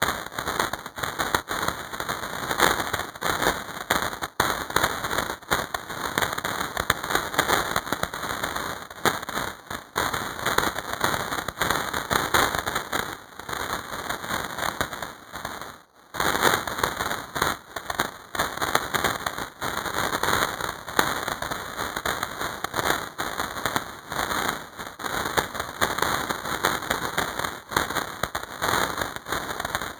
Sound effects > Objects / House appliances
Error TV 1 Noise Crushed
I synth it with phasephant! Used the Footsteep sound from bandLab PROTOVOLT--FOLEY PACK. And I put it in to Granular. I used Phase Distortion to make it Crunchy. Then I give ZL Equalizer to make it sounds better.
Error, Noise, Radio, TV